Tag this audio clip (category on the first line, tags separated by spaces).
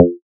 Instrument samples > Synths / Electronic
additive-synthesis bass fm-synthesis